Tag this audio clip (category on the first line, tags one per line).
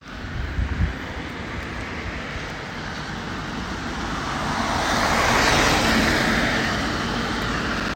Sound effects > Vehicles
car
road